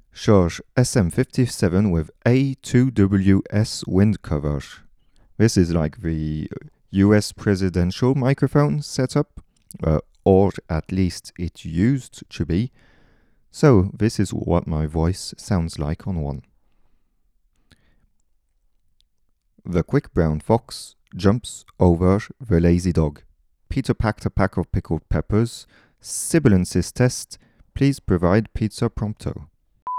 Speech > Solo speech
SM57 (A2WS cover) testing
Testing and some thoughts about the Shure SM57 and windcover as a speech microphone. Recorded with a SM57 and A2WS windcover, using a Tascam FR-AV2. Recorded indoors, in my bedroom which has way more reverb than id like... Date : 2025 05 26 I'm a mid 20s male if that's of any relevance. There's a few 1000hz tone to seperate some recordings. The middle one doesn't have the wind-cover.
talk, Mic-test, talking, hardware-testing, male, A2WS-Windcover